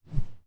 Sound effects > Electronic / Design
Subject : Recording a whoosh from a Broomstick (without the broom, just the plastic stick) Date YMD : 2025 July 12 Location : Indoor, Espéraza, France. Soundman OKM1 Weather : Processing : Trimmed in Audacity fade in/out. Maybe some denoise.